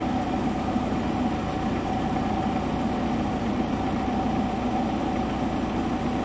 Sound effects > Vehicles
final bus 10
bus, hervanta